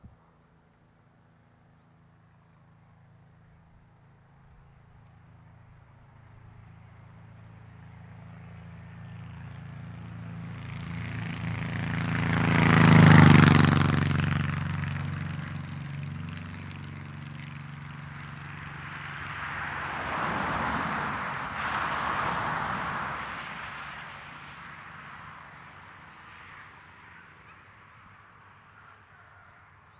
Soundscapes > Urban
AMB - CarPasses on a Highway in RuilleSurLoir, France - 02Jul2025,1723H
Ambience of multiple car, motorbike, and a traktor passing. Recorded in Ruille Sur Loir, France with Shure Motiv mic connected to iPhone 14.
AMB, Car, France, Highway, Motorbike, Passes, RuilleSurLoir, SFX, Traktor